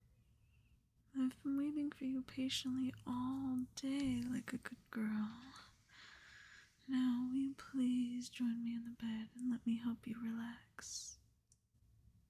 Speech > Solo speech
I've been waiting patiently for you all day, now can I help you relax?
Daddy, Sexy